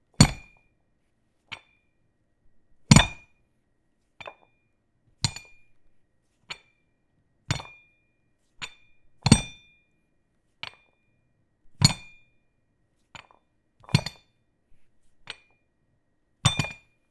Sound effects > Objects / House appliances
Picking up and dropping dumbbells plate on a yoga mat.
impact, metal, thud